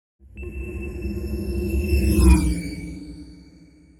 Sound effects > Electronic / Design
A SpaceCraft Passing from L to R, Designed with a synth